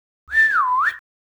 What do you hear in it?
Speech > Other
A sound effect of a whistle. Useful to use for a dog call or any other circumstances you can think of also. UPDATE 2/05/2025 I have uploaded a simple little example video of an idea for how to perhaps use this sound combined with another sound from my collection. This example is for a game makers fyi if you have another use for it thats great! Made by R&B Sound Bites if you ever feel like crediting me ever for any of my sounds you use. Good to use for Indie game making or movie making. This will help me know what you like and what to work on. Get Creative!
Call, Calling, Dog, Whistle